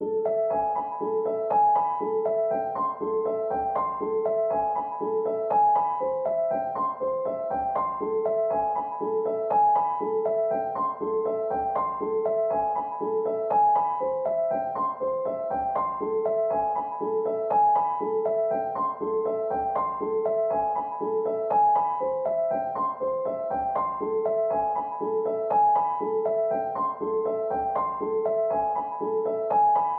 Music > Solo instrument
Piano loops 193 octave up long loop 120 bpm
120, 120bpm, free, loop, music, piano, pianomusic, reverb, samples, simple, simplesamples